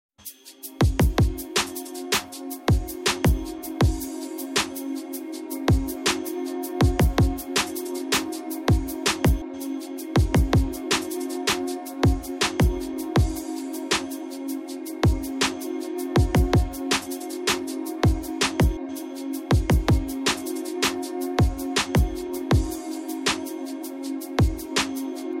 Music > Multiple instruments
music beats 11
Music song track with beats .
Drum, Drums, Symphony, Musical, Beats, Track, Dance, Music, Percussion, Loop